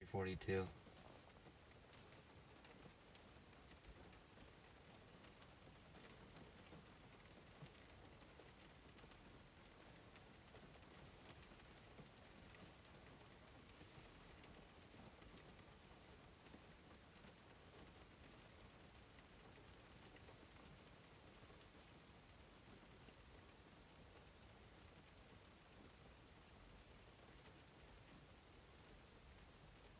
Sound effects > Natural elements and explosions
Rain hitting car
Light rain hits a car.
rain, weather